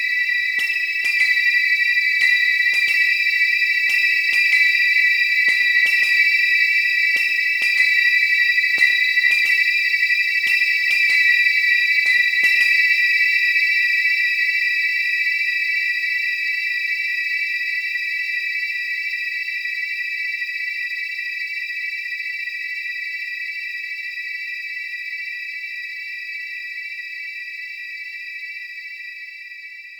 Instrument samples > String
a led melody
dub-step, effect